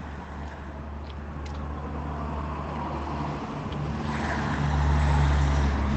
Sound effects > Vehicles
Busy traffic on an asphalt road approx. 20 meters away, including one car with a distinctive deep audible engine sound. Recorded near an urban highway in near-zero temperature, using the default device microphone of a Samsung Galaxy S20+.